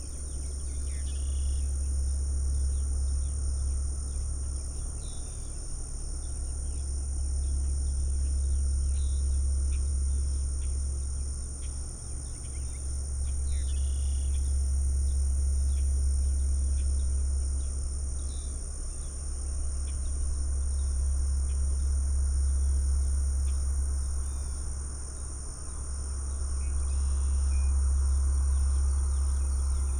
Soundscapes > Urban
AMBSea-Summer Country Road bordering marshland on Oyster Bay, early morning, crickets, birds, passing traffic, 615AM QCF Gulf Shores Alabama Zoom F3 with LCT 440 Pure
County Road bordering marshland on Oyster Bay, Gulf Shores, Alabama. Passing traffic, birds, crickets, wind, 6:15AM, summer.
marsh, birds, summer, traffic, field-recording, morning, crickets